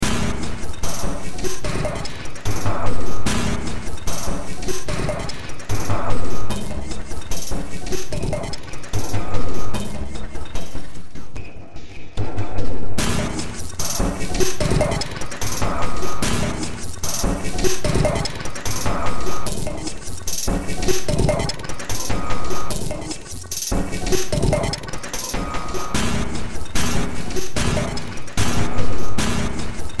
Music > Multiple instruments
Short Track #3913 (Industraumatic)
Horror, Cyberpunk, Underground, Sci-fi, Industrial, Games, Soundtrack, Noise, Ambient